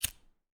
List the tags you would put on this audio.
Sound effects > Objects / House appliances
lighter
light
striking
flame

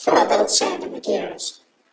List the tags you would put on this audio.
Speech > Processed / Synthetic
Filtered
Robot
Speech

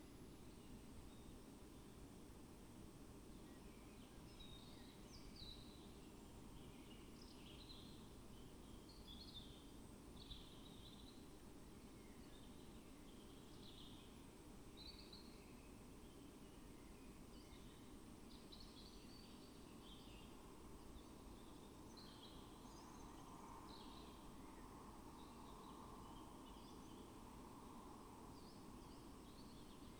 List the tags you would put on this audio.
Soundscapes > Nature
data-to-sound
weather-data
modified-soundscape
nature
Dendrophone
phenological-recording
natural-soundscape
soundscape
artistic-intervention
alice-holt-forest
sound-installation
raspberry-pi
field-recording

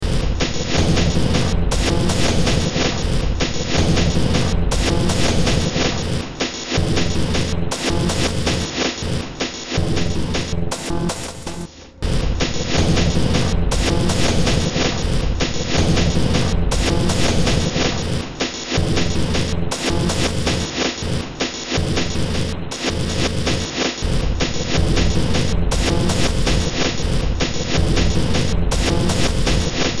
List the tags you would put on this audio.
Music > Multiple instruments
Soundtrack
Underground
Ambient
Horror
Noise
Sci-fi
Industrial
Cyberpunk
Games

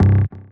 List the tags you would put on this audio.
Instrument samples > Synths / Electronic
bass
bassdrop
clear
drops
lfo
low
lowend
sub
subbass
subs
subwoofer
synth
synthbass
wavetable
wobble

